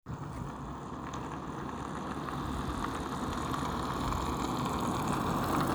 Soundscapes > Urban
voice 14-11-2025 3 car
Car, CarInTampere, vehicle